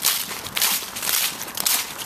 Sound effects > Human sounds and actions
Walking on autumn leaves. This sound was recorded by me using a Zoom H1 portable voice recorder.
autumn, footsteps, leaves, steps, walk